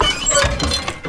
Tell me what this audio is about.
Sound effects > Other mechanisms, engines, machines
Handcar Pump 5 (In 3)
Handcar aka pump trolley, pump car, rail push trolley, push-trolley, jigger, Kalamazoo, velocipede, gandy dancer cart, platelayers' cart, draisine, or railbike sound effect, designed. Third push sound of a total of 6.
animation, cart, crank, cranking, creak, creaking, draisine, gandy, hand, handcar, hand-crank, hard, heavy, hydraulic, iron, jigger, kalamazoo, mechanism, metal, pump, pumping, push, rail, railbike, sound-design, squeak, squeaking, trolley, vehicle, velocipede